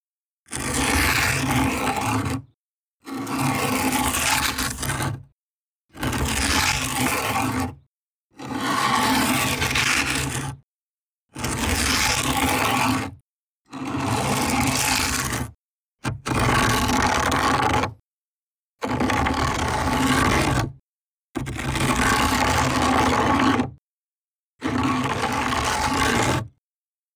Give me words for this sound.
Sound effects > Other
Granular stone pushed onto a concrete surface. * No background noise. * No reverb nor echo. * Clean sound, close range. Recorded with Iphone or Thomann micro t.bone SC 420.